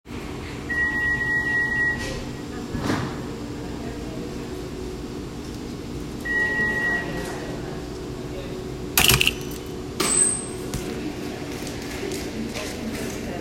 Soundscapes > Indoors
Public cafe area sounds.
Cafe, Beeping, Ambience